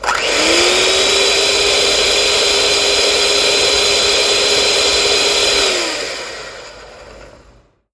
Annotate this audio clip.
Sound effects > Objects / House appliances
An electric mixer turning on, running at high speed and turning off.